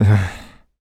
Speech > Solo speech
Displeasure - Urrr
Video-game, Mid-20s